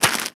Sound effects > Human sounds and actions
Recorded on ZoomH1n and processed with Logic Pro.